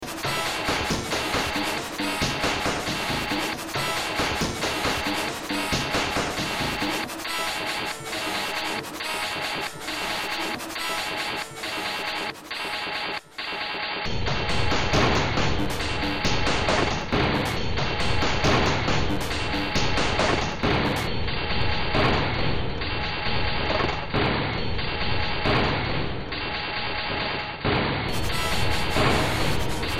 Music > Multiple instruments
Short Track #3629 (Industraumatic)
Industrial, Cyberpunk, Underground, Sci-fi, Soundtrack, Noise, Horror, Ambient, Games